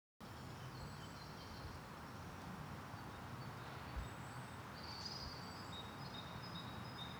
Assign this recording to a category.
Soundscapes > Nature